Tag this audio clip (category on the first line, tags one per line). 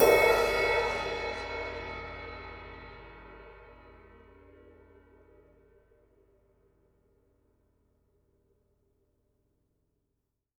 Music > Solo instrument
15inch
Crash
Custom
Cymbal
Cymbals
Drum
Drums
Kit
Metal
Oneshot
Perc
Percussion
Sabian